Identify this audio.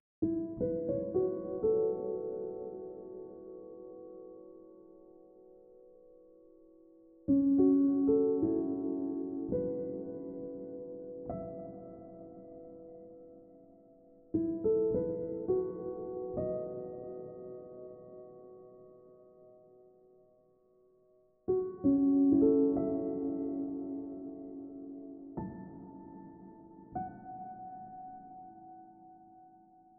Music > Solo instrument
Reflective Piano Notes
A short excerpt of piano notes, with a melancholic aesthetic. This would be suitable for moments of reflection and grief.